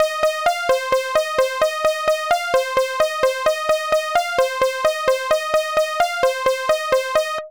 Music > Solo instrument
mysterious bell melody
This is a melody made with the plugin of fl studio called Classic, perfect for a techno track or a mystery intro on loop.